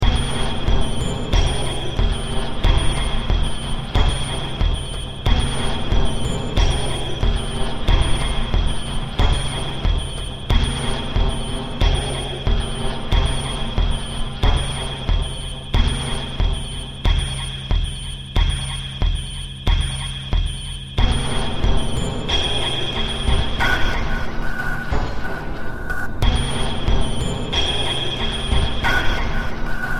Music > Multiple instruments
Demo Track #3024 (Industraumatic)
Sci-fi, Ambient, Underground, Noise, Industrial, Games, Cyberpunk, Horror, Soundtrack